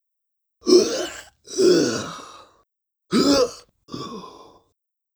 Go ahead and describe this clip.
Speech > Solo speech
Enemy Death x2
Another Sound bite of a couple of versions of a human enemy dying for a game project of some sort. Apologies for a little static in end of end sound bite on this one must of been to close to mic and over zealous. Should be fine if you game has music anyway only noticeable if you make a silent game otherwise which in unlikely. Made by R&B Sound Bites if you ever feel like crediting me ever for any of my sounds you use. Good to use for Indie game making or movie making. This will help me know what you like and what to work on. Get Creative!
Enemy, Game, Death, RPG